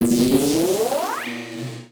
Sound effects > Electronic / Design
As described. A quick, artificial high-voltage sound effect.